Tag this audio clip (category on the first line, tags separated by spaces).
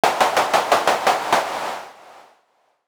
Sound effects > Electronic / Design
gun games